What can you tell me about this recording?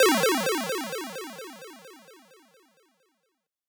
Sound effects > Electronic / Design
8-bit "pliu-pliu-pliu..." ARP
8-bit arp that i created and processed in DAW; I'll just call it "pliu-pliu" sound, because . Anyway, Ы.
videogame, arcade, 8-bit, chippy, retro